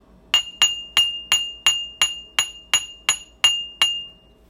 Sound effects > Vehicles
Bicycle bell ringing SFX (mimicked using glass cup and metal)
Created this sound using a glass cup and a metal belt buckle with rhythmic tapping. I was trying to mimic a bicycle bell ringing. Recorded with iphone 16 pro max and in quiet room.
bell, bike, ding, ring, ting